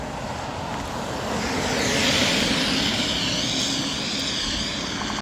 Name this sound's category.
Sound effects > Vehicles